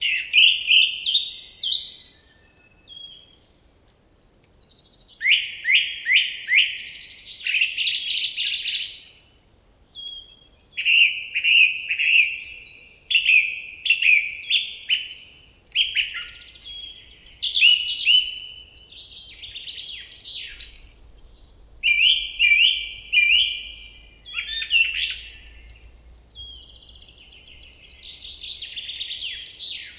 Sound effects > Animals
Beautiful birdsong 3
ambience, background, birds, birdsong, calm, environmental, European-forest, field-recording, forest, natural, nature, outdoor, peaceful, Poland, rural, singing, soundscape